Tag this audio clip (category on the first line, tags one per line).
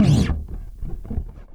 Music > Solo instrument
riff
slide
electric
chords
pluck
fuzz
chuny
slides
notes
rock
blues
harmonics
pick
low
funk
harmonic
bass
basslines
bassline
slap
lowend
riffs
electricbass
note